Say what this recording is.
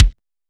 Instrument samples > Percussion
Synthwave-Kick 4.5
Retouched from Kitton 3 instrument plugin. Processed with ZL EQ, FuzzPlus3, Waveshaper, Khs Transient shaper, Frutiy Limiter.
Kick, Synthwave, Drum, Acoustic